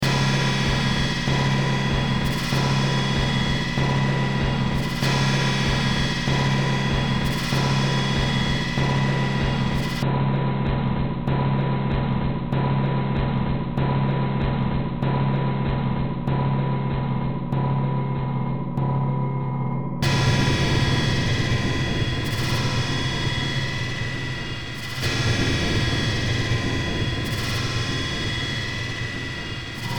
Music > Multiple instruments
Demo Track #2999 (Industraumatic)
Industrial, Underground, Cyberpunk, Horror, Games, Sci-fi, Ambient, Soundtrack, Noise